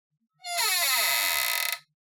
Sound effects > Other mechanisms, engines, machines
Squeaky Hinge
Creaky, Hinge, Squeaky